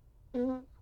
Sound effects > Human sounds and actions
Small fart
Just a little fart. Not a big one.
fart, gas, flatulation, flatulence, poot